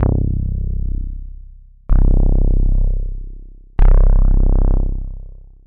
Instrument samples > Synths / Electronic
VSTi Elektrostudio (Model Mini)
bass, synth